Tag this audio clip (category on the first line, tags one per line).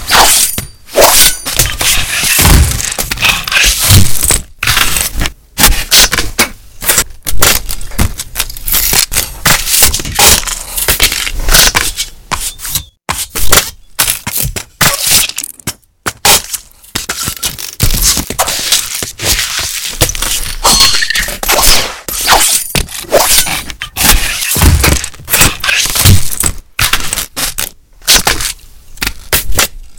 Sound effects > Human sounds and actions
assassination
blood
Dare2025-09
execution
killing
slaying
stab